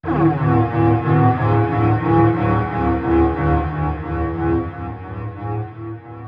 Music > Other
Unpiano Sounds 007
Samples of piano I programmed on a DAW and then applied effects to until they were less piano-ish in their timbre.